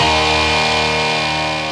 Instrument samples > Synths / Electronic
Phonk Cowbell-Electric Guitar Cowbell D# key
Layered 2 directwave instrument of flstudio. Distored with Plasma.